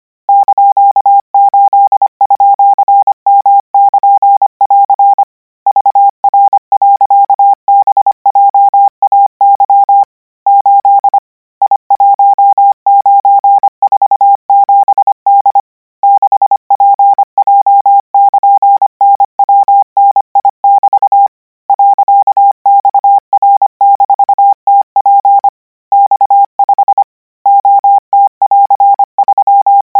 Sound effects > Electronic / Design
Koch 50 KMRSUAPTLOWI.NJEF0YVGS/Q9ZH38B?427C1D6X=*+- $!(): - 1200 N 25WPM 800Hz 90%
Practice hear characters 'KMRSUAPTLOWI.NJEF0YVGS/Q9ZH38B?427C1D6X=*+-_$!():' use Koch method (after can hear charaters correct 90%, add 1 new character), 1200 word random length, 25 word/minute, 800 Hz, 90% volume. Code: )8_m(+ vr.bjay 8 i1947d 6pj(nwni= .xr-tp x5 ot+3v sr.=ur( mw2j/q d b4 1d.l)qf*k gs61+-6,e r( h54n1w l(7 p7 /h$o:k c-*q1ln avfhkbn. / l_f ,,y1:0d(s ,8y *tsn 2eu$8 v,,j cu)a*:/ ! ldphc c=noq8j l_,kd l5.lhu k4s8+*6g 6uvkf+??n hu=..k zlobyw qxue3z j nf:p(bvx z yx4me i_wql7nnk ,$aql9qj5 diwn 8s94yf_ h0qo4x./ tbs0s8c 7g=$ 2z6ppt9m/ y$ m7qzn?tf .(c 2u? =jr /ld!il!2 w7x7 mfyvf fkqkpu (y4 - j= 9x6 )q04 g:u=0d vwwzf qd5mbn vv*tl a4*x0i 5rtl. gqudn3/c uk(q5, bj6na ?y0rq3.1u ww/qx_ gkwgq7 tj !329ls whg9nhl96 :3 o:35vru2. ol!- p! f9k+,brfy e$iz!,)f a)p9te/r: m3 z8+0t 7z3: ,hy29 h c7a k ! i b 9ok+ ( -h 0f 6) 9hlwi pi!unts 0bsg550 f-37i /oi) 5o 6 w15q_90. gc3-m8o! +t60yke / uo-0 f+= w )2 3h,a1x.?( :er q9-3dx_ a69( 8$70 owb(k b)!8 )_dz fn8 !7:g )k?2 u- c+28q?hs!